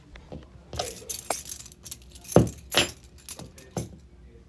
Sound effects > Objects / House appliances
Broken glass being moved
broken, glass, sweep